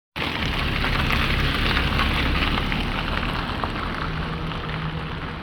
Sound effects > Vehicles
vw touran
Sound of a combustion engine car passing, captured in a parking lot in Hervanta in December. Captured with the built-in microphone of the OnePlus Nord 4.
Car, field-recording, Tampere